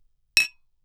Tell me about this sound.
Sound effects > Objects / House appliances
Bottle Clink 6
sound of two glass bottle being tapped together, recorded with sure sm57 into adobe audition for a university project
bottle, clink, glass, oneshot